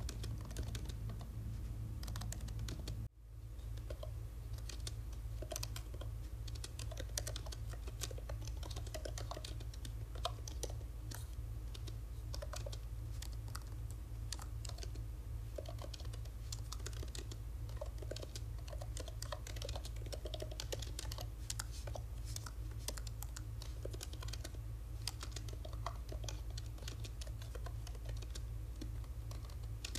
Sound effects > Objects / House appliances
Clicking and clacking of keyboard typing